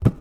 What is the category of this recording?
Sound effects > Objects / House appliances